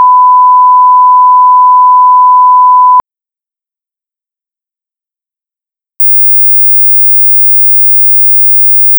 Sound effects > Experimental
Italy Flag
Warning LOUD! Using the waveform preview and frequencies to draw in it. Here you have 1000hz for green. Transparent for white if you have light theme on. 20khz for red.
sound-to-image, Italia, waveform-preview, image, Italy